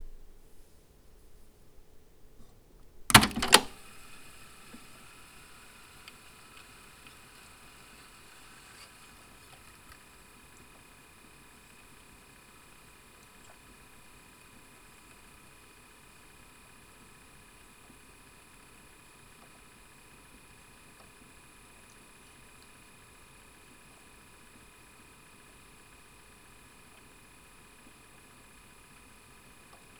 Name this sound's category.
Sound effects > Objects / House appliances